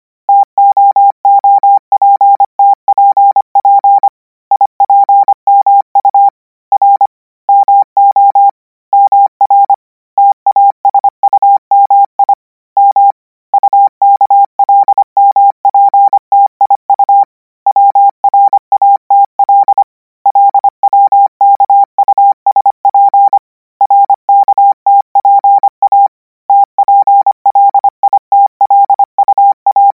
Sound effects > Electronic / Design
Koch 12 KMRSUAPTLOWI - 440 N 25WPM 800Hz 90%
Practice hear characters 'KMRSUAPTLOWI' use Koch method (after can hear charaters correct 90%, add 1 new character), 440 word random length, 25 word/minute, 800 Hz, 90% volume.